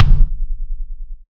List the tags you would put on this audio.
Instrument samples > Percussion
attack
bass
bass-drum
bassdrum
beat
death-metal
drum
drums
fat-drum
fatdrum
fat-kick
fatkick
forcekick
groovy
headsound
headwave
hit
kick
mainkick
metal
natural
Pearl
percussion
percussive
pop
rhythm
rock
thrash
thrash-metal
trigger

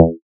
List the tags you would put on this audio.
Instrument samples > Synths / Electronic
bass
fm-synthesis
additive-synthesis

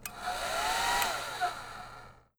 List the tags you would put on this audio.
Sound effects > Objects / House appliances
turn-on
Blue-brand
turn-off
low-speed
hair-dryer
short
run
Blue-Snowball

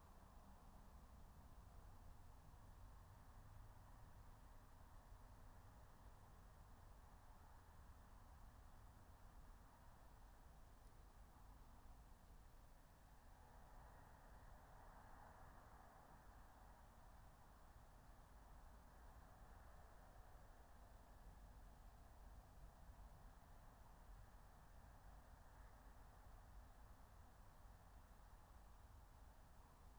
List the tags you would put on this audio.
Soundscapes > Nature
field-recording
phenological-recording
raspberry-pi
meadow
natural-soundscape
nature
alice-holt-forest
soundscape

